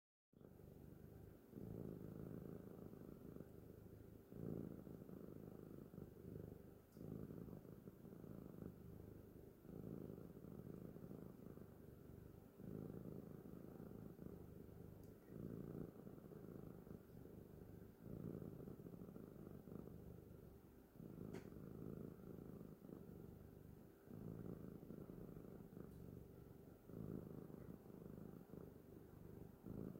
Sound effects > Animals
Cat purring calm close recording
Calm and soft cat purring recorded at close range. Relaxing and steady. Recorded from my own cat, with a smartphone microphone (Samsung Galaxy S22).